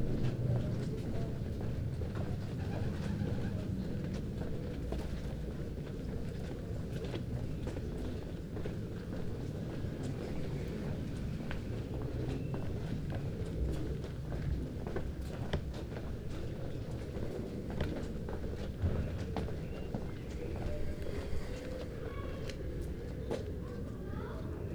Sound effects > Human sounds and actions
Quiet street steps
Man walks in quiet small street in the beguinage of Tongeren, Belgium. Birds. Children.
steps, street, beguinage